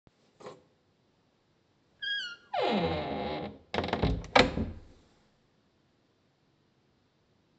Soundscapes > Indoors
Creaking wooden door v04
Creaking wooden room door closes